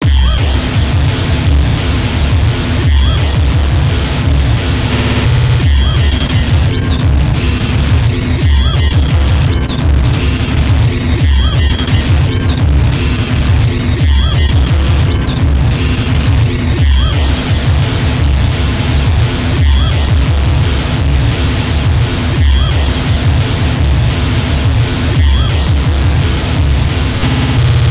Music > Multiple instruments
harz break 172bpm
RC-20 with some FM modulators
172bpm, break